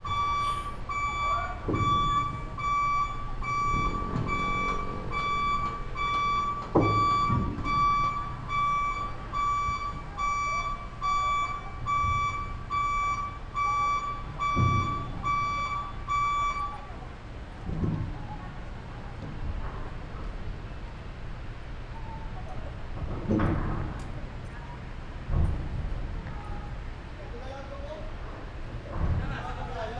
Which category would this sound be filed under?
Soundscapes > Other